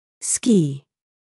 Speech > Solo speech
to ski
english, pronunciation, voice, word